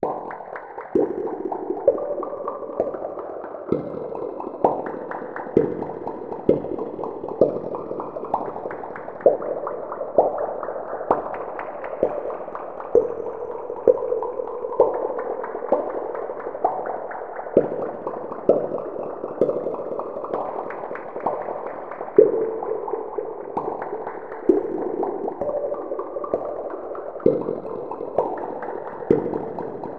Soundscapes > Synthetic / Artificial
Blip blop reverb and echoes #002
blip, blop, echo, ipad, reverb